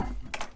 Sound effects > Other mechanisms, engines, machines
metal shop foley -064
pop, little, oneshot, sound, foley, thud, bop, knock, boom, perc, tools, shop, bang, rustle, crackle, wood, percussion, strike, metal, sfx, bam, tink, fx